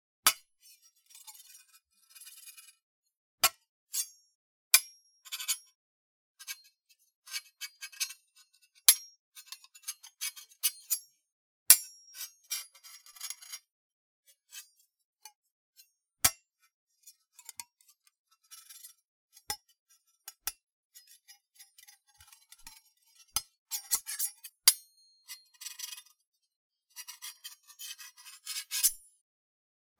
Sound effects > Objects / House appliances
Knives or Swords Scraping Together
Two knifes held together and rattling slightly. Made for that specific moment in a duel where two swordsmen hold their blades together and are still putting pressure on them, creating a very quiet clattering noise. I couldn't find anyone else who had this sound so I recorded it myself.
blade, clatter, clattering, contact, duel, echo, knife, metal, metallic, scrape, scrapping, sword, together